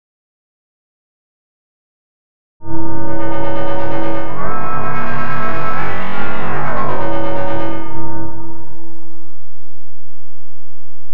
Sound effects > Electronic / Design
Sci-Fi Beam Device - Hollow Distorted
A beam sound I accidentally made using loopback feedback from Reason to Reaper. Sounds like a beam weapon charging up or something. Used in a few of my other sounds.
particle, zap, energy, weapon, electric, nuclear, beam, distortion, hollow, charge, tachyon, device, laser